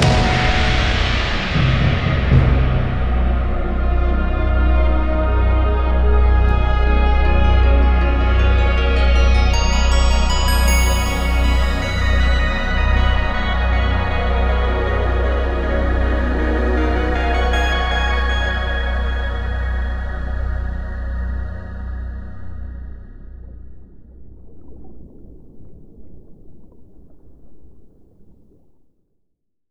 Music > Multiple instruments
Victory Fanfare (Resplendent Ocean)
An ocean-themed victory fanfare with thick orchestral instrumentation accompanied by the sound of flowing water and some synth pads.